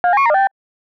Sound effects > Other mechanisms, engines, machines
A talkative sounding small robot, bleeping. I originally designed this for some project that has now been canceled. Designed using Vital synth and Reaper